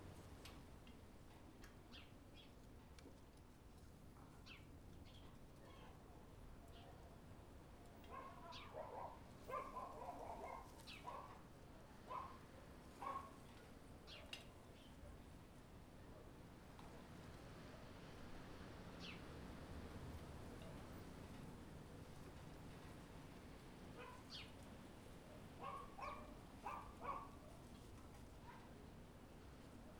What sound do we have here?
Soundscapes > Nature
Ambience Street CalmWind
ambient, atmosphere, background, calm, city, field-recording, light-breeze, nature, outdoor, soundscape, street, weather, wind